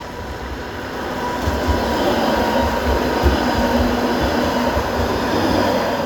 Sound effects > Vehicles
tram-samsung-4
outside, vehicle, tram